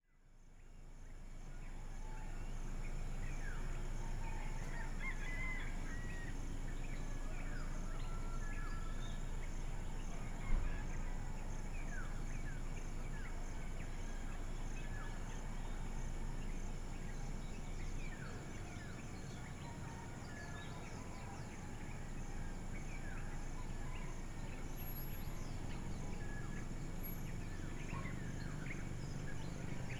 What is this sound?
Soundscapes > Urban
250721 050601 PH Dawn chorus and sunrise in Filipino suburb
Dawn chorus and sunrise in a Filipino suburb. I made this recording at about 5:15AM, from the terrace of a house located at Santa Monica Heights, which is a costal residential area near Calapan city (oriental Mindoro, Philippines). One can hear the atmosphere of this place during sunrise, with some crickets, dawn chorus from local birds that I don’t know, roosters and dogs barking in the distance, as well as some distant fishermen’s motorboats and traffic hum. At about #6:00, the cicadas slowly start to make some noise, and at #10:20, the bell from the nearby church starts ringing. Recorded in July 2025 with a Zoom H6essential (built-in XY microphones). Fade in/out applied in Audacity.
ambience, birds, cicadas, Philippines, residential, soundscape